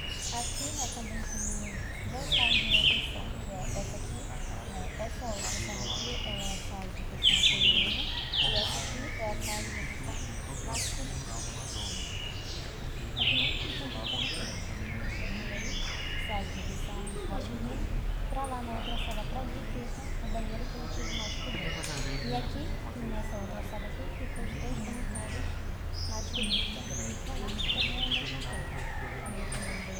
Soundscapes > Nature
AMBBird-ZH6 Paricatuba, nature, park, historic, birds singing, bem te vi, relics, tourist guide, walla, reverb, portuguese FILI URPRU
Ambiência. Pássaros, natureza, parque, histórico, canto, bem-te-vi, guia turístico, vozerio, reverberação, português brasileiro. Gravado em Paricatuba, Amazonas, Amazônia, Brasil. Gravação parte da Sonoteca Uirapuru. Em stereo, gravado com Zoom H6. // Sonoteca Uirapuru Ao utilizar o arquivo, fazer referência à Sonoteca Uirapuru Autora: Beatriz Filizola Ano: 2025 Apoio: UFF, CNPq. -- Ambience. Birds, nature, park, historic, birds singing, bem te vi, relics, tourist guide, walla, reverb, portuguese recorded at the Ruins of Paricatuba, Amazonas. Recorded at Paricatuba Amazonas, Amazônia, Brazil. This recording is part of Sonoteca Uirapuru. Stereo, recorded with the Zoom H6. // Sonoteca Uirapuru When using this file, make sure to reference Sonoteca Uirapuru Author: Beatriz Filizola Year: 2025 This project is supported by UFF and CNPq.
amazon, amazonas, ambience, bem-te-vi, birds, birdsong, brasil, brazil, field-recording, guide, nature, portuguese, soundscape, sound-studies, touristic, trees, walla